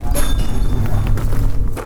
Sound effects > Objects / House appliances
Junkyard Foley and FX Percs (Metal, Clanks, Scrapes, Bangs, Scrap, and Machines) 29
Ambience, Metal, Clang, Atmosphere, Bang, Perc, scrape, Foley, FX, Junk, SFX, Junkyard, Bash, rattle, Robot, Clank, tube, trash, dumping, Machine, Smash, Robotic, Environment, waste, garbage, dumpster, Dump, Metallic, rubbish, Percussion